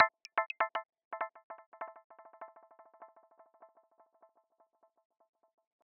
Sound effects > Electronic / Design
Pluck-Bubule Pluck A Min Chord
Just a easy botanica pluck that synthed with phaseplant. All plugin used from Khs Ultimate only.